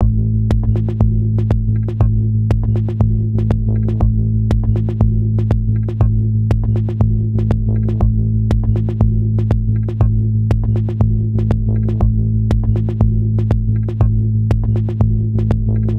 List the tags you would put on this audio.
Music > Multiple instruments
120-bpm
120bpm
dark
drum
drum-loop
groovy
industrial
loop
loopable
percussion-loop
rhythm

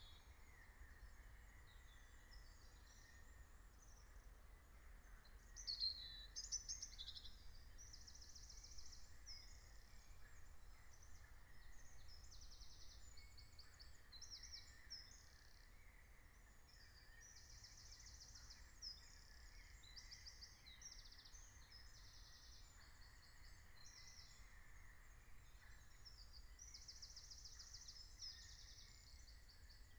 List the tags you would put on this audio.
Soundscapes > Nature
nature
alice-holt-forest
natural-soundscape
meadow
soundscape

